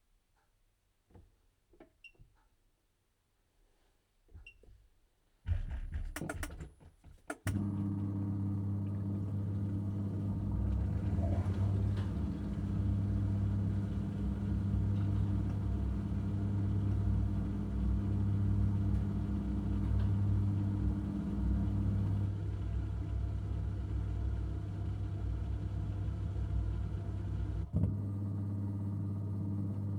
Sound effects > Objects / House appliances
Dish washer inside - Dji3 dual L in R out.
Subject : Recording a dish washer with Dji Mic 3s, L side is inside a glass jar inside the dishwasher, R is clipped to the lower right side. Date YMD : 2025 September 14 Location : Gergueil 21410 Bourgogne-Franche-Comté Côte-d'Or France. Hardware : Dji mic 3 Weather : Processing : Trimmed synced and panned and normalised in Audacity. Notes : Left side switches off mid take, I think the recorder of the water made the mic over-heat and shut off. Not very interesting to hear the insides, looks like it's mostly the jet of water splashing into whatever you have covering the microphone.
dish, dish-washer, dji, dji-mic3, inside, mic3, omni, washer, washing